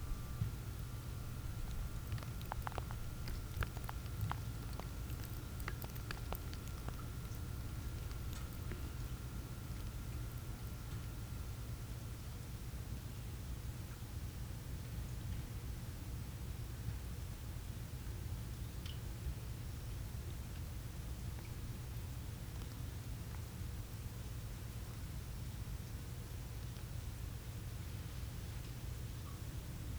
Soundscapes > Nature
Snow Silence - 10min Ambient Winter Soundscape
10-minute continuous recording of profound snow silence (Jan 2026). Captured with Zoom M3 MikTrak in stereo during snowfall, revealing subtle micro-sounds: faint wind through pines, distant crow calls, and the absolute stillness between. Ideal for sound design in theatre/opera. No processing - pure field recording.
hush, winter, silence, snowambience, winterjapan, snow, japan, hyogo, soundscape, fieldsrecording, naturalsilence, quiet, asmr, snowfall, distantwind, ambient, snowscape